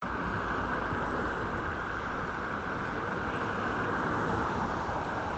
Sound effects > Vehicles
Busy traffic on a wet asphalt road, 20 to 30 meters away. Recorded near an urban highway in near-zero temperature, using the default device microphone of a Samsung Galaxy S20+.